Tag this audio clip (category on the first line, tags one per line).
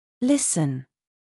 Speech > Solo speech
pronunciation
voice
english
word